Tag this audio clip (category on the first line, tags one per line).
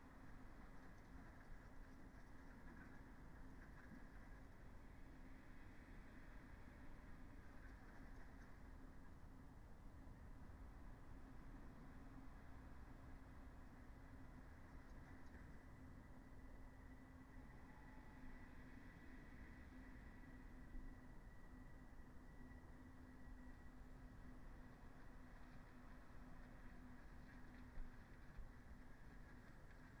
Soundscapes > Nature
alice-holt-forest
artistic-intervention
natural-soundscape
sound-installation
nature
modified-soundscape
Dendrophone
phenological-recording
weather-data
field-recording
soundscape
data-to-sound
raspberry-pi